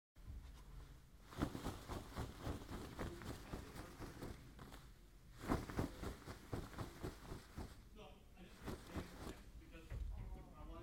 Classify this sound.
Sound effects > Animals